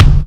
Instrument samples > Percussion
loudkick short 1
(Very frontal/unbass, very beater/striker/mallet/attack-based kick. I don't like it.)
attack, bass, bass-drum, bassdrum, beat, death-metal, drum, drums, fat-drum, fatdrum, fat-kick, fatkick, forcekick, groovy, headsound, headwave, hit, kick, mainkick, metal, natural, Pearl, percussion, percussive, pop, rhythm, rock, thrash, thrash-metal, trigger